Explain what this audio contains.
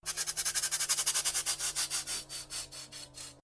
Sound effects > Other
TOONVeh-MCU Skid, Broken Nicholas Judy TDC
A broken skid.
Blue-brand, broken, skid, cartoon, Blue-Snowball